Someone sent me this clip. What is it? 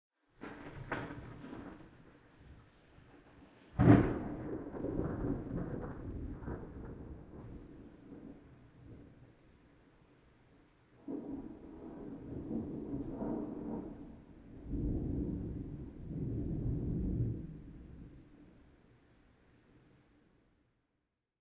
Sound effects > Natural elements and explosions
Distant Thunder Denoised 01
A distant thunder strike that was recorded on a Blue Yeti Pro XLR in Stereo Mode, denoised using RX 11.